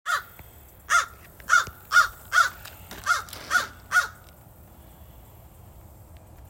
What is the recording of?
Soundscapes > Nature
Crow crowing in a nearby tree in South Carolina. Recorded with an iPhone 16 Pro.
crow, field-recorded, crowing